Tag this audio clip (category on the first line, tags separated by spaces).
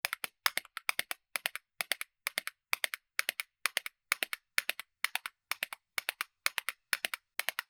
Instrument samples > Percussion
Gallop Hit Horse Minimal Musical Percussion Slap Spoon Strike Wood